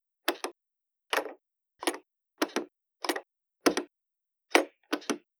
Sound effects > Objects / House appliances
opening and closing a door
Opening and closing an indoor wooden door multiple times. Recorder with iphone11 microphone.
closing, doors, door, opening, open